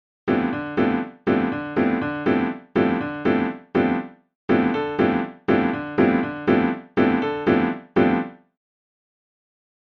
Music > Solo instrument
i made this by drawing UWU! and OWO!